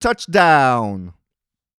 Speech > Solo speech
Subject : A mid 20s dude saying Touchdown. Weather : Sunny day, mostly blue ideal pockets of clouds. 23°c 10km/h wind. Processing : Trimmed and Normalized in Audacity. Probably some fade in/out.
20s, A2WS, announcer, down, Dude, FR-AV2, indoor, Male, Mid-20s, Shure, Sm57, Sm57-A2WS, Tascam, touch, touchdown, voice-acting